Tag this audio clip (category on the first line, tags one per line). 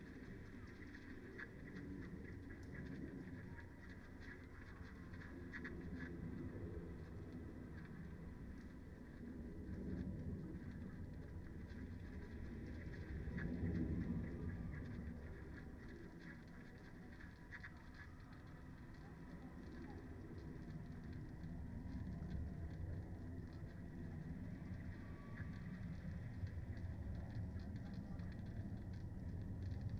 Soundscapes > Nature
phenological-recording
artistic-intervention
data-to-sound
nature
field-recording
sound-installation
weather-data
modified-soundscape
soundscape
Dendrophone
raspberry-pi
alice-holt-forest
natural-soundscape